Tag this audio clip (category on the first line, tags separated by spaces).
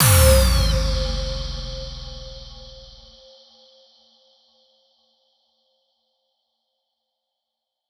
Sound effects > Electronic / Design
level new